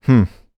Speech > Solo speech
Annoyed - Humph
annoyed, dialogue, FR-AV2, grumpy, Human, Male, Man, Mid-20s, Neumann, NPC, oneshot, singletake, Single-take, talk, Tascam, U67, upset, Video-game, Vocal, voice, Voice-acting